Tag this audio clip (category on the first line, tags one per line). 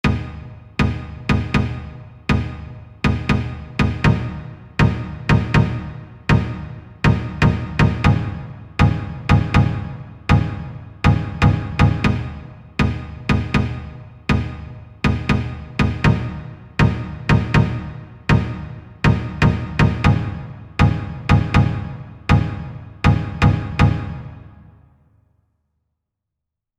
Instrument samples > Synths / Electronic
120
Bass
bpm
Clap
Dance
Drum
Drums
EDM
Electro
Free
House
Kick
Loop
Music
Slap
Snare
Synth